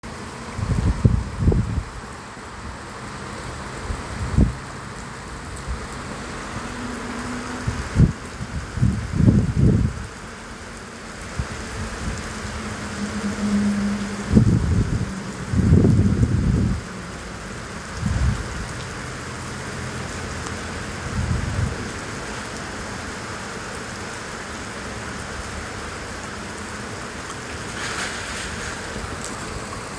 Soundscapes > Urban
Heavy Rain near a Main Road
sudden rain recorded on a grocery parking lot near a main road
Rain, Storm, Weather, Wind